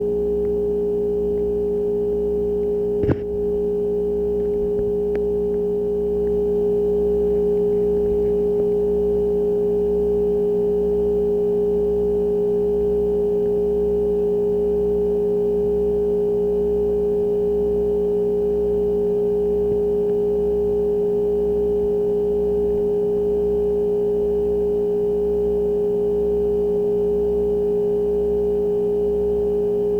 Sound effects > Objects / House appliances
MACHAppl Brazil-Machines, Appliances, Refrigerator, fridge, loud hum, light frequency, interior, Apartment, Rio de Janeiro, Zoom H6, contact mic BF mono
Sound recorded on Zoom H6 with a handmade contact microphone of a refrigerator at night, approximately 1 a.m. There's a constant, high frequency beep and a weak hum, both constant noises.